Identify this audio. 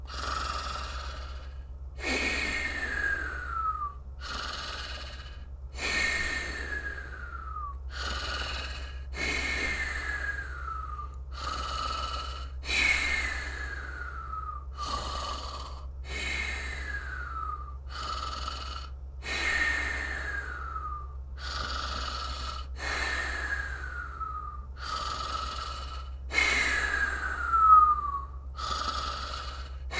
Sound effects > Human sounds and actions
HMNSnor-Blue Snowball Microphone, CU Snoring, Comedy, Snorting, Whistling Nicholas Judy TDC
Blue-brand, Blue-Snowball, cartoon, comedy, human, snore, snort, whistle